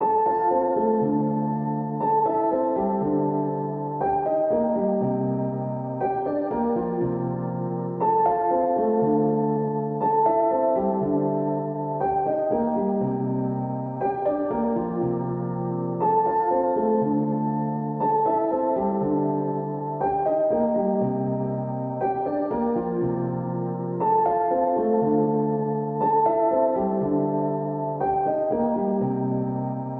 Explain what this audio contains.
Music > Solo instrument
Piano loops 096 efect 4 octave long loop 120 bpm
120, music, free, 120bpm, loop, simple, reverb, samples, piano, simplesamples, pianomusic